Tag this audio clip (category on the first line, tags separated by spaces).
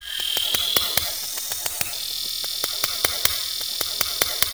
Sound effects > Electronic / Design
Alien Trippin Otherworldly Digital Drone FX Experimental Neurosis Abstract Robotic Mechanical Noise Analog Synthesis Spacey Automata Buzz Creatures Droid Glitch Trippy Creature